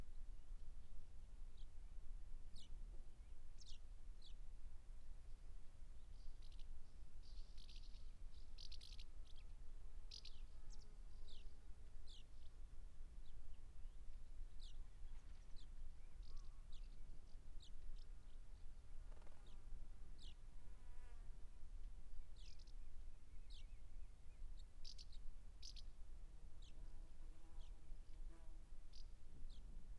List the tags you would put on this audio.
Soundscapes > Nature
Torhamn
Bird
Field-Recording
Chirping
Field
Around
Sweden
Martin
Rural
Song
Flying
House
Island
Daytime
Utlangan
Wind

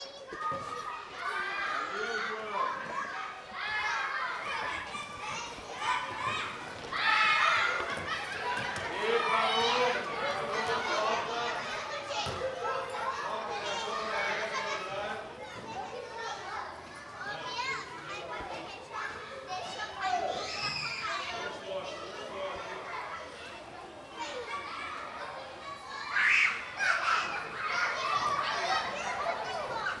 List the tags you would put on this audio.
Soundscapes > Indoors
kindergarten
brasileiros
yelling
walla
recreio
talking
school
voices
children
portugues
escola
shcool-yard
child
portuguese
brazil
kid
vozerio
patio
infantil
brazilians
brasil
zoomh1n
kids
playground

